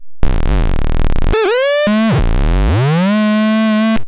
Sound effects > Electronic / Design
Optical Theremin 6 Osc dry-020
Sounds from an Optical Theremin i built using a 74C14 HEX Schmidtt inverter. There are 6 oscillators built from photoresistors, joysticks from old PS2 controllers, and various capacitors and pots. The light source used in these recordings was ambient and direct sunlight coming from the skylights in my music studio. Further processing with infiltrator, shaperbox, and various other vsts was also implemented on some of the sounds in this pack. Final batch processing was done in Reaper
Glitchy, Otherworldly, Bass, Dub, Optical, FX, Robot, Robotic, Trippy, Infiltrator, noisey, Sweep, Instrument, DIY, Analog, Alien, Experimental, Theremin, Scifi, Theremins, Sci-fi, SFX, Electronic, Handmadeelectronic, Spacey, Synth, Noise, Electro, Digital, Glitch